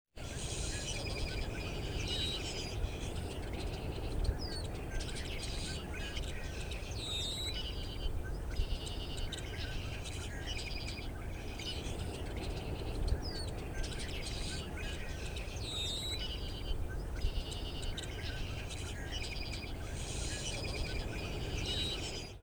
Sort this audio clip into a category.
Soundscapes > Nature